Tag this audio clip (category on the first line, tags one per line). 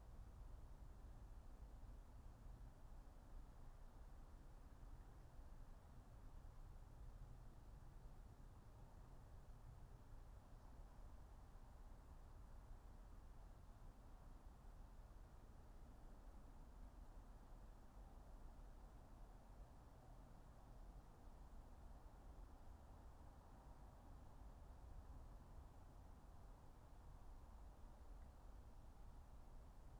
Soundscapes > Nature
data-to-sound
natural-soundscape
field-recording
soundscape
artistic-intervention
sound-installation
weather-data
phenological-recording
modified-soundscape
nature
alice-holt-forest
raspberry-pi
Dendrophone